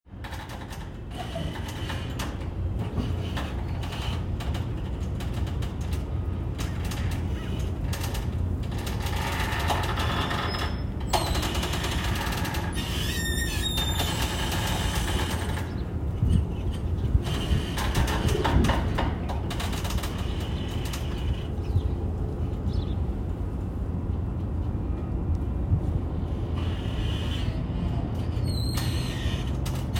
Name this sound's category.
Sound effects > Vehicles